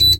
Sound effects > Objects / House appliances
BEEPAppl-Samsung Galaxy Smartphone, CU Air Fryer, Beep, Single Nicholas Judy TDC
An air fryer beep.